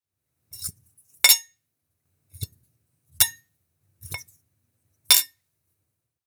Sound effects > Objects / House appliances
KITCH Cinematis CutleryForkPlastic PickUpPutDown PlatePorcelane Fast 02 Freebie
A plastic fork striking a porcelain plate fast. This is one of several freebie sounds from my Random Foley | Vol. 3 | Cutlery pack. This new release is all about authentic cutlery sounds - clinks and taps on porcelain, wood, and ceramic.